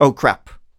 Speech > Solo speech
Surprised - Oh crap 3
oneshot, Male, NPC, Neumann, Single-take, U67, surprised, dialogue, Tascam, singletake, talk, Man, FR-AV2, Human, Vocal, Mid-20s, Video-game, Voice-acting, voice